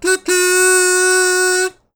Music > Solo instrument
A kazoo 'ta-da!' accent.